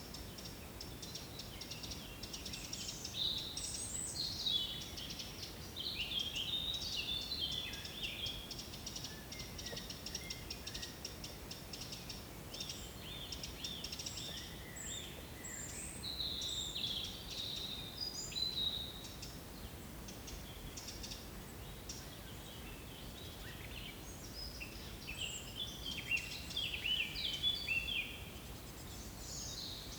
Soundscapes > Nature
20250418 12h00-15h00 - Gergueil forest chemin de boeuf
field-recording, Rural, country-side, Zoom-H2N, nature, forret, ambiance, France, spring, Cote-dor, H2N, 2025, Bourgogne-Franche-Comte, MS, 21410, windless, ambience, Mid-side, April, Forest, birds, Gergueil